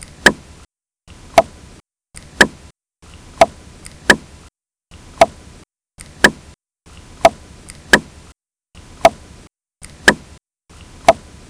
Sound effects > Objects / House appliances
This is the sound effect of an old clock ticking that I vocalized. The "tick-tock" repeats a few times.